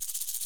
Instrument samples > Percussion
Dual shaker-019
percusive, recording